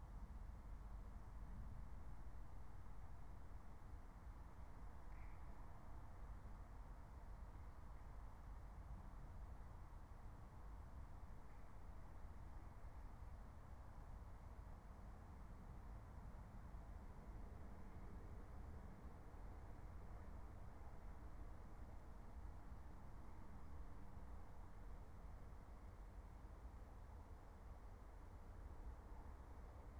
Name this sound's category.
Soundscapes > Nature